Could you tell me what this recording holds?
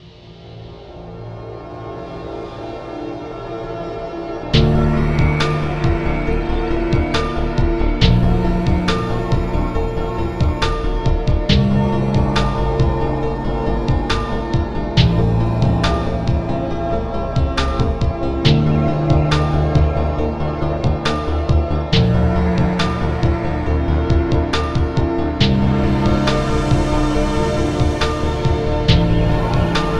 Music > Other
Intro cinematic scene score
theme, soundtrack, cinematic